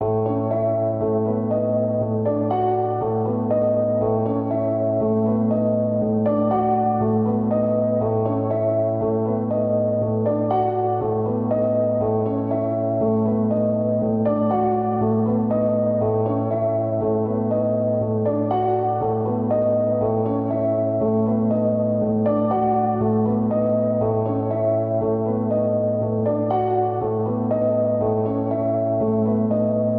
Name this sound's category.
Music > Solo instrument